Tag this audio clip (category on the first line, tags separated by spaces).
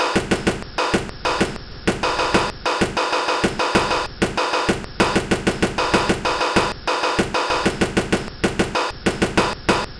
Instrument samples > Percussion
Dark Loop Loopable Underground